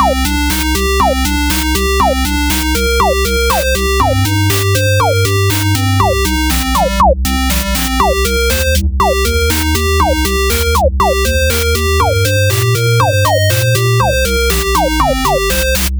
Music > Multiple instruments
A looping 8-bit pirate tune inspired by classic sea shanties. Built from scratch in FL Studio using only native synths like Sytrus for triangle bass and 3xOsc for pulse leads and square wave chords. Mixed with Fruity Parametric EQ 2 to keep the layers balanced. Designed to feel like an upbeat march that sets the tone for the first chapter of Treasure Island. Works as background music for games, videos, or creative projects. Seamless 8-bar loop. Add the credit in your video description, game credits, or project page.